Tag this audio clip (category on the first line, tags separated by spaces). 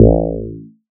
Instrument samples > Synths / Electronic
bass fm-synthesis additive-synthesis